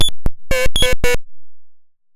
Sound effects > Electronic / Design
Optical Theremin 6 Osc dry-081
Robot, noisey, Glitchy, Otherworldly, Infiltrator, Instrument, Synth, Dub, Electronic, Sci-fi, Sweep, DIY, Robotic, Digital, Spacey, Experimental, Bass, Theremins, FX, Theremin, Electro, Handmadeelectronic, Optical, SFX, Analog, Alien, Scifi, Trippy, Noise, Glitch